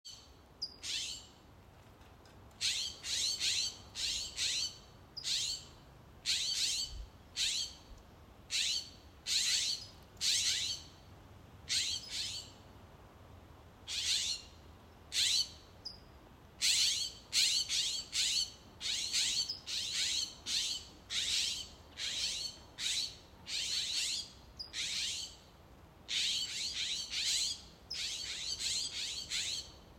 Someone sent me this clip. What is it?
Soundscapes > Nature
Bluebirds & amp; evening breeze , this is a sound that jays make while protecting their nest, most likely our cat Ru is somewhere near .